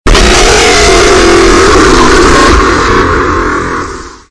Sound effects > Other
This sound was made as a test, but it's spooky Tags are : Loud Spooky creepy scary Horror Thrill Terrifying Nightmare Haunted Terror
terror, creepy, terrifying, nightmare, haunted, loud, horror, spooky, scary, thrill